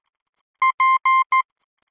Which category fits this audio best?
Sound effects > Electronic / Design